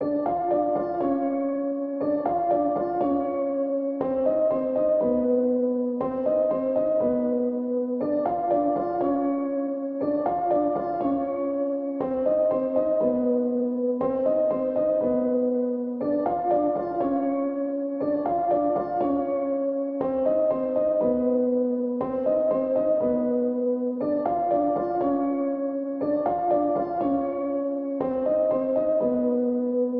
Music > Solo instrument
Piano loops 075 efect 4 octave long loop 120 bpm
120, 120bpm, free, loop, music, piano, pianomusic, reverb, samples, simple, simplesamples